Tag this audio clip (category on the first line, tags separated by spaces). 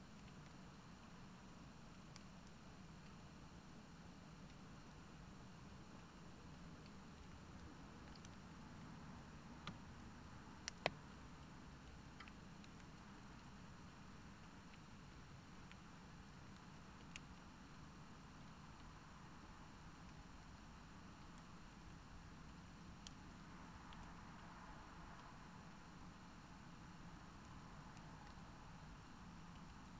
Soundscapes > Nature
raspberry-pi soundscape